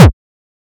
Instrument samples > Percussion
8 bit-Kick5
FX, percussion, game, 8-bit